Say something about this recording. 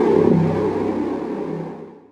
Instrument samples > Synths / Electronic
CVLT BASS 116
bass, bassdrop, clear, drops, lfo, low, lowend, stabs, sub, subbass, subs, subwoofer, synth, synthbass, wavetable, wobble